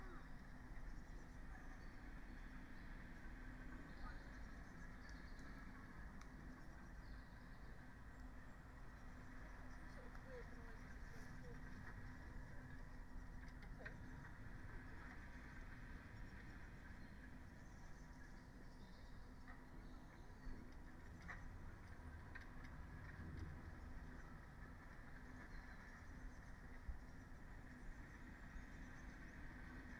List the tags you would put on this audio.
Soundscapes > Nature
phenological-recording
Dendrophone
raspberry-pi
nature
alice-holt-forest
natural-soundscape
modified-soundscape
sound-installation
artistic-intervention
field-recording
weather-data
soundscape
data-to-sound

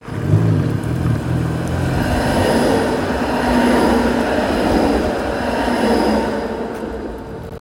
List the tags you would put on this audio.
Sound effects > Vehicles
tampere
tram

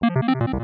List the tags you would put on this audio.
Sound effects > Electronic / Design
digital
selection

